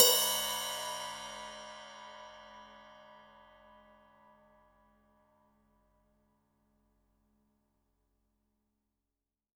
Music > Solo instrument
Cymbal hit with knife-006
Crash, Custom, Cymbal, Cymbals, Drum, Drums, FX, GONG, Hat, Kit, Metal, Oneshot, Paiste, Perc, Percussion, Ride, Sabian